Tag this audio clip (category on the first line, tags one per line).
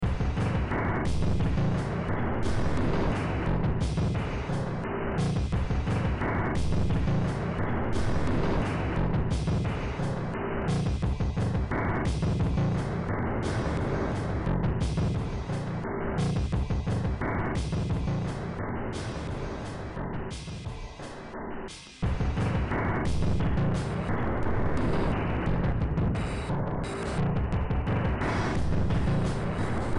Music > Multiple instruments
Soundtrack
Games
Horror
Sci-fi
Cyberpunk
Industrial
Noise
Ambient
Underground